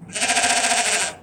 Sound effects > Animals
Sheep - Bleat; Medium Perspective
This is the sound of a bleating sheep.
baa, barnyard, bleat, ewe, farm, lamb, livestock, ram, sheep